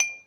Sound effects > Objects / House appliances
Lamp Single Ding Sound / Neon Lamp Ding Sound
This created by me with glass. Use this sound how you want it's completely free